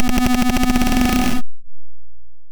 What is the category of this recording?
Sound effects > Electronic / Design